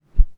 Sound effects > Natural elements and explosions
Stick - Whoosh 14 (disappear)
dissapear, fast, FR-AV2, magic, NT5, one-shot, oneshot, poof, pouf, Rode, SFX, stick, Swing, swinging, tascam, Transition, whoosh, whosh, Woosh